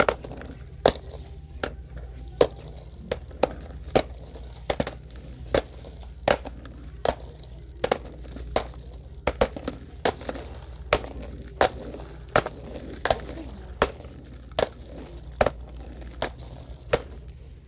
Sound effects > Objects / House appliances
Roller skates skating along with walking and rolling. In-line skates. Recorded at Goodwill at Richmond, VA.